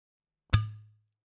Sound effects > Objects / House appliances
Popping the cork
The popping sound of uncorking a bottle of champagne. Close-up perspective.